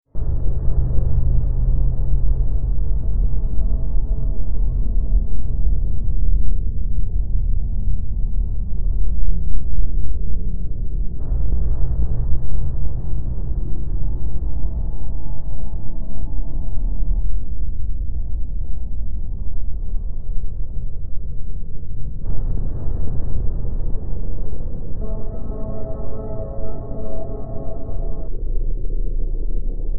Soundscapes > Synthetic / Artificial
Looppelganger #166 | Dark Ambient Sound
Ambience, Ambient, Darkness, Drone, Games, Gothic, Hill, Horror, Noise, Sci-fi, Silent, Soundtrack, Survival, Underground, Weird